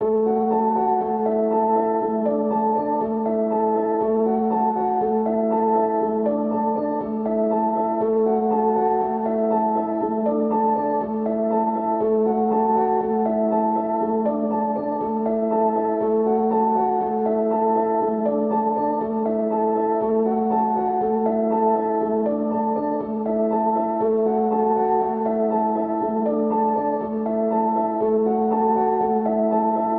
Music > Solo instrument
Piano loops 057 efect 4 octave long loop 120 bpm
Otherwise, it is well usable up to 4/4 120 bpm.